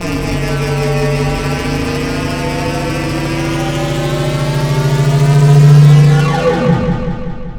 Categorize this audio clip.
Sound effects > Electronic / Design